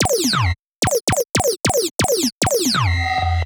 Sound effects > Electronic / Design
A simple, futuristic, small weapons fire SFX designed in Phaseplant. The file contains a few short blasts. 1 medium blast and 1 sustained blast.
short, weapon, sci-fi, fire, synthetic, shot, gun